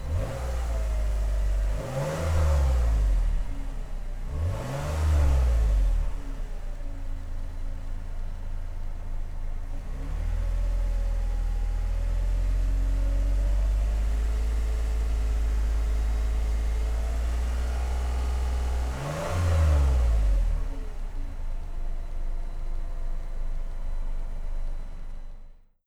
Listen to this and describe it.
Sound effects > Vehicles
VEHCar-Tascam DR05 Recorder Toyota Highlander, Revving Nicholas Judy TDC
A Toyota Highlander revving.
car, rev, Tascam-brand, Tascam-DR-05, Tascam-DR05, toyota-highlander